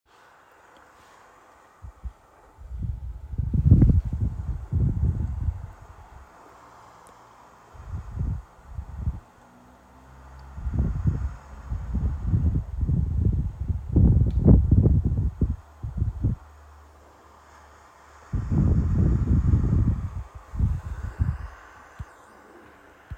Soundscapes > Nature
Wind on top of Mt. Marseilleveyre
Wind in Calanques National Park, top of Mt. Marseilleveyre.